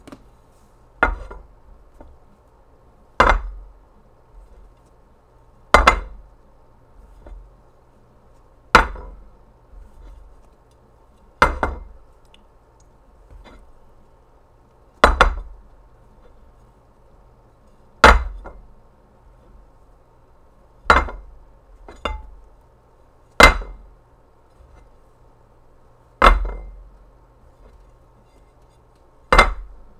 Sound effects > Objects / House appliances
FOODGware-Blue Snowball Microphone, CU Plate, Ceramic, Glass, Set Down, Pick Up Nicholas Judy TDC
A ceramic or glass plate setting down and picking up.
Blue-brand, Blue-Snowball, ceramic, foley, glass, pick-up, plate, set-down